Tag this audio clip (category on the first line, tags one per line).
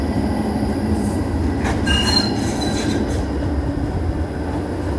Sound effects > Vehicles
Tampere
field-recording
traffic
tram
city